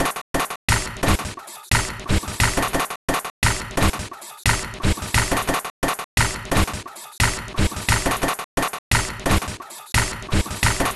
Instrument samples > Percussion
This 175bpm Drum Loop is good for composing Industrial/Electronic/Ambient songs or using as soundtrack to a sci-fi/suspense/horror indie game or short film.
Samples, Dark, Loopable, Soundtrack, Packs, Drum, Weird, Industrial, Underground, Loop, Alien, Ambient